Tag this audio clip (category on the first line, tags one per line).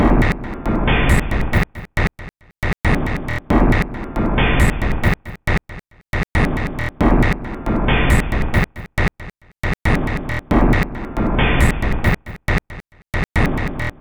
Instrument samples > Percussion
Ambient
Drum
Industrial
Loop
Loopable
Packs
Samples
Soundtrack
Underground
Weird